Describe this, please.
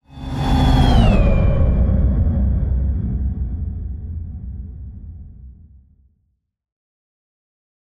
Sound effects > Other
Sound Design Elements Whoosh SFX 039
dynamic, sound, cinematic, transition, design, ambient, fx, element, effects, production, trailer, fast, film, sweeping, whoosh, movement, audio, swoosh, effect, elements, motion